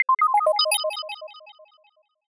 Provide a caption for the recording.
Sound effects > Electronic / Design
Digital interface SFX created using in Phaseplant and Portal.
interface, message